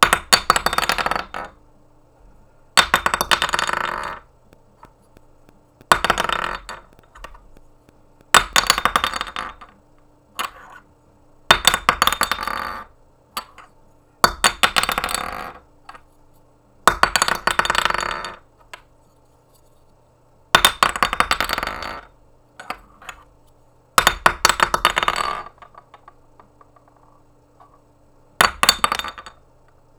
Sound effects > Objects / House appliances
FOLYProp-Blue Snowball Microphone, CU Snail Shell, Clatter Nicholas Judy TDC
A snail shell clattering.
Blue-brand, Blue-Snowball, clatter, foley, shell, snail